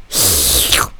Sound effects > Human sounds and actions
It's just me recording my own licking sound. Thanks!
Licking, Mouth, Sucking, Gross, Slobbering, Sucks, Drooling, Slurps, Tongue, Animation, Sloppy, Suck, Lick, Human, Cartoon, Vocals, Slurp, Licks, Slurping